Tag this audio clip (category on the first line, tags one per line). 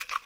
Sound effects > Other
game
interface
paper
rip
scrunch
tear
ui